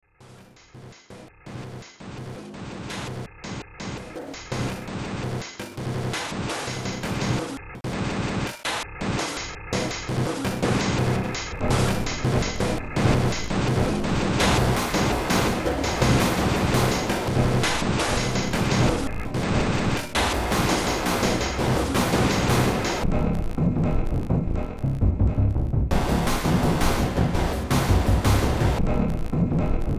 Music > Multiple instruments
Demo Track #3485 (Industraumatic)
Ambient, Cyberpunk, Games, Horror, Industrial, Noise, Sci-fi, Soundtrack, Underground